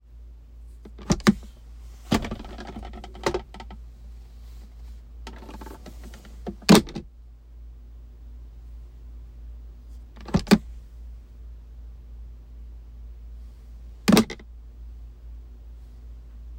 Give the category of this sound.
Sound effects > Vehicles